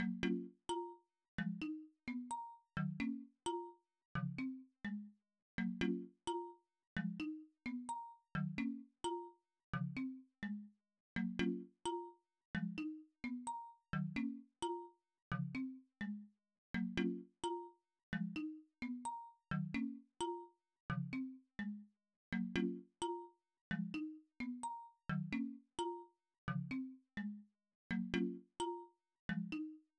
Music > Solo instrument
Some noise I cooked up, and other parts assembled in Logic Pro. Steal any stems. Or if you're trying to make music, hit me up! I'll actually make something of quality if you check out my other stuff. I think its in F (minor) tuning, but I cant tell.